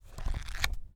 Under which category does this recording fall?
Sound effects > Objects / House appliances